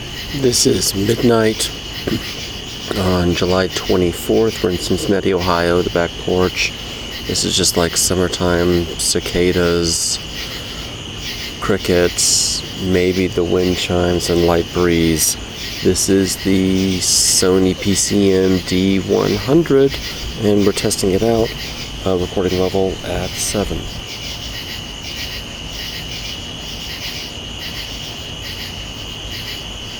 Soundscapes > Urban
AMBSubn-Summer Hot Summer Night in Backyard, cicadas, crickets, midnight QCF Cincinnati Ohio Sony D100
Hot Summer Evening in neighborhood park, Cincinnati, OH.
ambience, field-recording, park